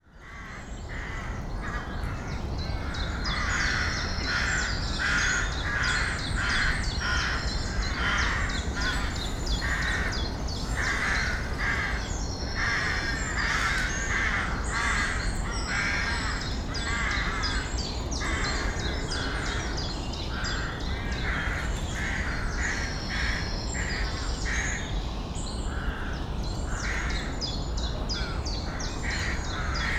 Soundscapes > Nature
An ambience recording at Middleton Lakes, Staffordshire. Day time. Recorded with a Zoom F3 and 2 Em272Z1 Omni directional mics.
recording, nature, field, birds, ambience